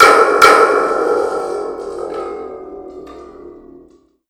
Music > Solo percussion
MUSCPerc-Blue Snowball Microphone, CU Thunder Tube, Double Crash Nicholas Judy TDC
Double thunder tube crash.
cartoon, Blue-Snowball